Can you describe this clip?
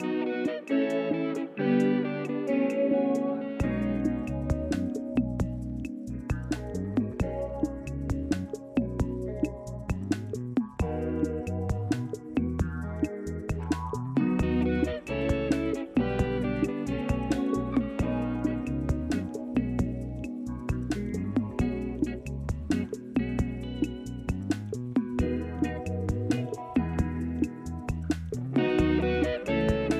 Music > Multiple instruments
jazz fusion bossa nova vibe
A jazz rumba bossa nova inspired chord sequence with electric guitar melodies, bass guitar and percussion. Gear Used: Abelton Line 6 Helix Meris Enzo synth tc electronic flashback delay